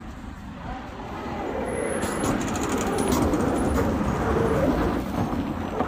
Sound effects > Vehicles
final bus 33
Bus Sound captured on iphone 15 Pro.
finland, bus, hervanta